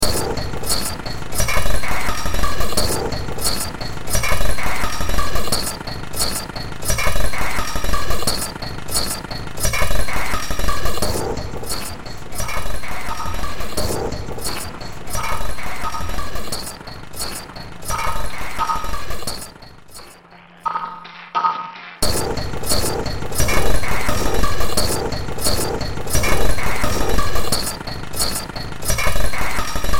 Music > Multiple instruments
Short Track #3586 (Industraumatic)
Track taken from the Industraumatic Project.